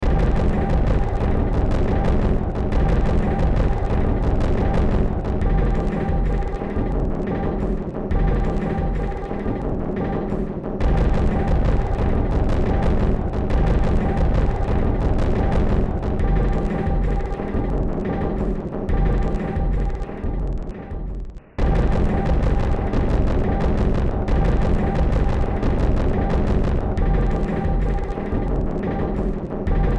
Music > Multiple instruments
Short Track #2986 (Industraumatic)
Horror, Games, Sci-fi, Noise